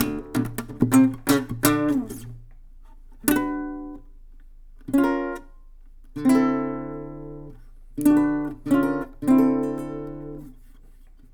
Music > Solo instrument
acoustic guitar slap 3
acosutic guitar chord chords string strings pretty dissonant riff solo instrument slap twang knock
knock, dissonant, guitar, string, chords, solo, instrument, pretty, riff, chord, acosutic, strings, twang, slap